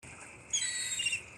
Sound effects > Animals
Seabirds - Ring-billed Gull; Single Call
A gull, most likely a ring-billed, chirps at the back of a strip mall in Pooler, GA. Recorded with an LG Stylus 2022.